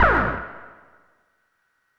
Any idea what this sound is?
Instrument samples > Synths / Electronic
Benjolon 1 shot21
1SHOT, DRUM, SYNTH